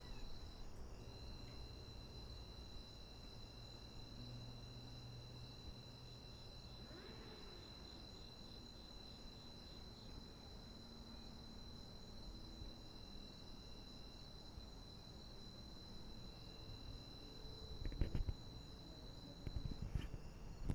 Soundscapes > Nature
Loud cricket and motorcycle
Recording crickets in backyard of my house in Columbus Ohio and motorcycle revs in background. Recorded by me zoom h1 essential
Motorcycle, revving